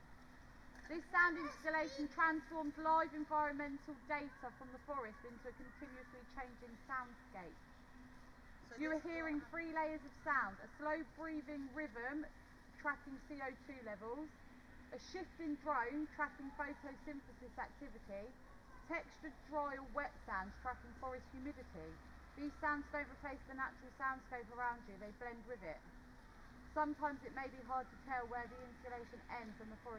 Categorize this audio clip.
Soundscapes > Nature